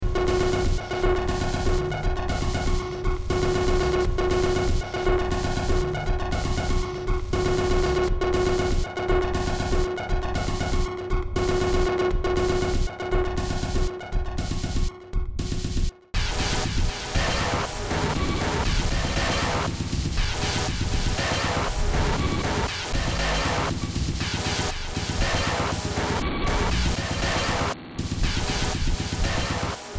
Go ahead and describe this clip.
Music > Multiple instruments
Demo Track #3937 (Industraumatic)
Ambient, Cyberpunk, Games, Horror, Industrial, Noise, Sci-fi, Soundtrack, Underground